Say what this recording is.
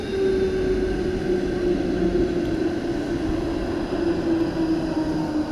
Sound effects > Vehicles
A tram slowing by in Tampere, Finland. Recorded with OnePlus Nord 4.
tram, transport, vehicle